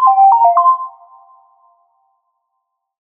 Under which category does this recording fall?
Instrument samples > Piano / Keyboard instruments